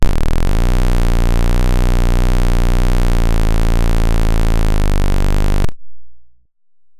Sound effects > Electronic / Design
Optical Theremin 6 Osc dry-008
Electro, Experimental, Infiltrator, Robotic, SFX, Synth, Theremin, Theremins